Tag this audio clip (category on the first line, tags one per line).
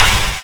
Instrument samples > Percussion
Avedis
bang
china
clang
clash
crack
crash
crunch
cymbal
cymbals
Istanbul
low-pitched
Meinl
metal
metallic
multicrash
Paiste
polycrash
Sabian
sinocrash
sinocymbal
smash
Soultone
spock
Stagg
Zildjian
Zultan